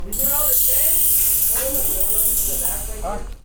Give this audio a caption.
Sound effects > Objects / House appliances
Junkyard Foley and FX Percs (Metal, Clanks, Scrapes, Bangs, Scrap, and Machines) 77
Ambience, Atmosphere, Bang, Bash, Clang, Clank, Dump, dumping, dumpster, Environment, Foley, FX, garbage, Junk, Junkyard, Machine, Metal, Metallic, Perc, Percussion, rattle, Robot, Robotic, rubbish, scrape, SFX, Smash, trash, tube, waste